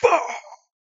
Speech > Other
Short Death Sound
It's a short sound I recorded for fun with my mic
death, die, game, kill, killing, murder, short